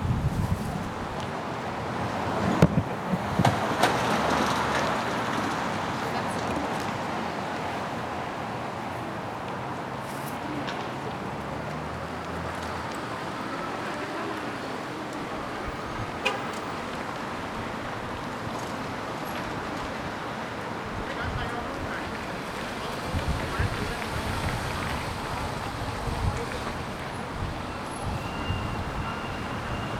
Soundscapes > Urban
Pioneer Square Seattle 2025-03-21 14.13.07
Recorded this with my Shure mic one spring day in 2025
Cars, City, Intersection, Karabegovic, Nurko, Public, Recoded, Seattle, Street, Traffic, Urban